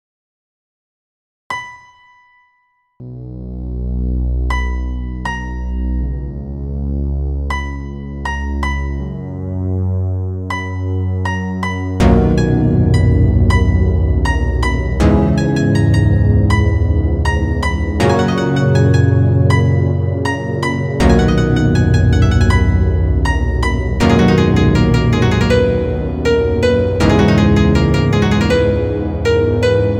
Music > Multiple instruments
Made in the Walk Band app. Moving orchestral piece.